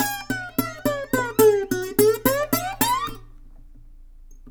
Music > Solo instrument
acoustic guitar slide22
acosutic, chord, chords, dissonant, guitar, instrument, knock, pretty, riff, slap, solo, string, strings, twang